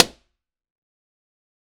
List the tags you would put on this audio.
Soundscapes > Other
Balloon blanket-fort convolution home Impulse-and-response resonating reverb Rode Tascam

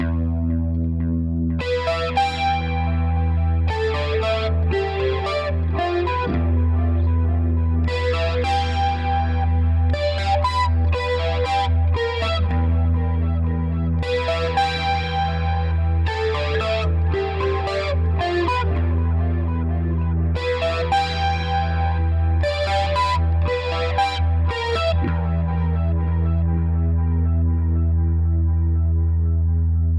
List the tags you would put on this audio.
Music > Solo instrument
delay electric

